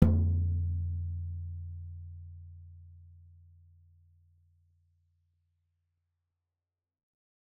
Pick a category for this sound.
Music > Solo percussion